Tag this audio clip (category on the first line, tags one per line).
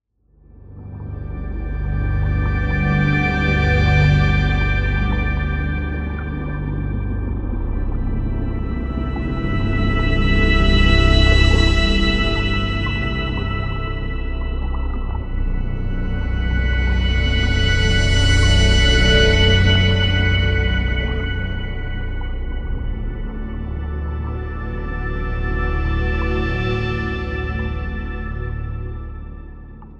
Music > Multiple instruments
ambient-ocean-music
ambient-ocean-theme
ambient-water-music
ambient-water-theme
aquatic
aquatic-ambience
aquatic-musical-ambience
beach
Dylan-Kelk
gentle-water-music
gentle-waters
ocean
ocean-ambience
ocean-atmosphere
ocean-theme
ocean-vibes
raft-ambience
relaxing-ocean-music
relaxing-water-music
sea-atmosphere
sea-vibes
water
water-biome
water-level
water-level-theme
water-vibes
watery
watery-ambience